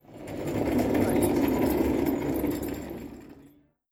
Sound effects > Objects / House appliances
A wheelie bin rolling by. Recorded at Lowe's.